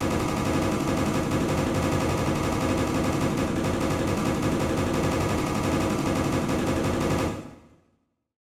Sound effects > Other
gun shot rapid fire
A rapid shot for a gun.